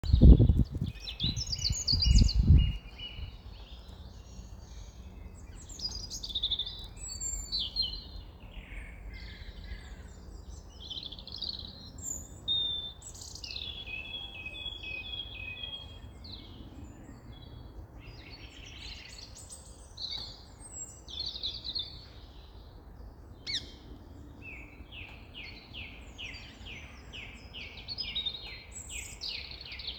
Soundscapes > Nature
Spring Birdsong & Wind
I'm not sure exactly what birds are calling here, but on the day I saw a lot of blue tits, robins, and blackbirds (UK)
birds, birdsong, blackbird, forest, nature, robin, spring, summer, wind